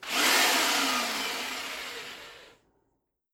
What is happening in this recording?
Sound effects > Objects / House appliances
TOOLPowr-Samsung Galaxy Smartphone, CU Skill Saw, Short, Quick Burst Nicholas Judy TDC
A short, quick skill saw burst.
burst, Phone-recording, quick, short, skill-saw